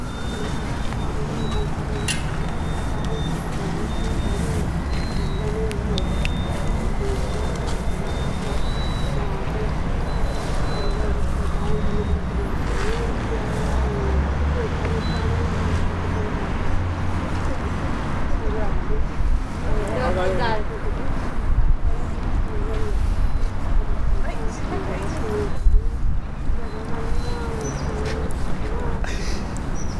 Soundscapes > Urban
20250312 JardinsMontbauV21 People Birds Chill
Birds, Chill, Jardins, Montbau, People